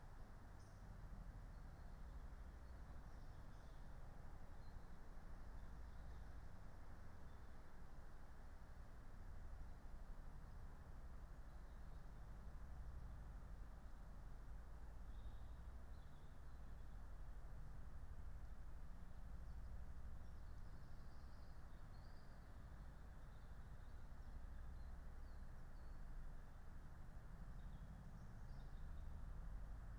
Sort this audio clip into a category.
Soundscapes > Nature